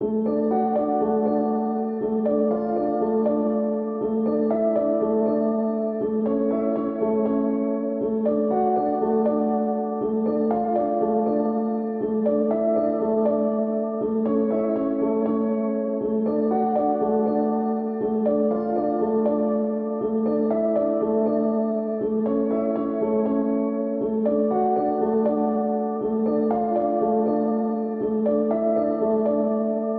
Music > Solo instrument
Piano loops 152 efect 4 octave long loop 120 bpm
120, pianomusic, simple, free, samples, piano, loop, simplesamples, 120bpm, reverb